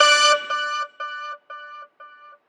Sound effects > Electronic / Design
STABBI ONE
A synth stab.
synth, synthesizer, stab, sounddesign, electronic